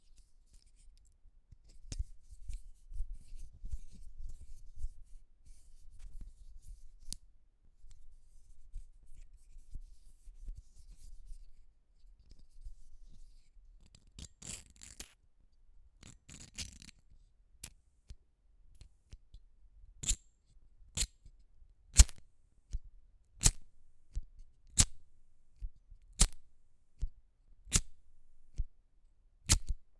Sound effects > Objects / House appliances
Subject : Sounds of bic mini lighter. Handling noise and opening the gas, rotating the flint etc... Date YMD : 2025 06 08 Location : Albi 81000 Tarn Occitanie France. Indoors Hardware : Tascam FR-AV2, Rode NT5. Weather : Night time Processing : Trimmed in Audacity.
handling, lighter, Mono